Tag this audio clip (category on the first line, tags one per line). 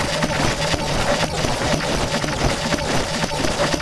Sound effects > Electronic / Design
commons
creative
free
industrial
industrial-noise
industrial-techno
noise
rhythm
royalty
sci-fi
scifi
sound-design